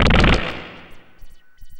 Instrument samples > Synths / Electronic
Benjolon 1 shot8
SYNTH, BENJOLIN, DRUM, MODULAR, CHIRP, NOISE